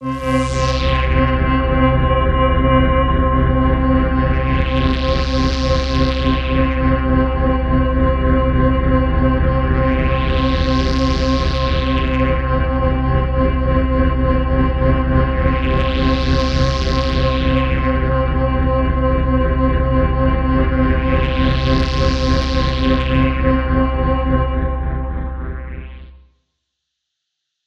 Instrument samples > Synths / Electronic
Synth Ambient Pad note C4 #005
cinematic, ambient, space-pad, pad, C4, one-shot, synth